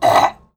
Sound effects > Human sounds and actions
TOONMisc-Blue Snowball Microphone, CU Bite, Bone, Crunch Nicholas Judy TDC
Bone bite or crunch.
vocal, Blue-Snowball, chomp, cartoon, bite, crunch, Blue-brand, bone